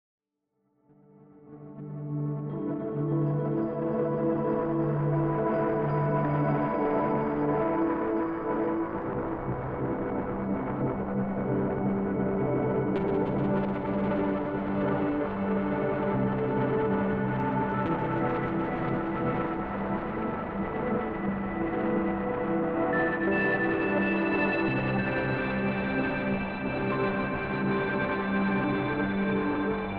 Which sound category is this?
Music > Other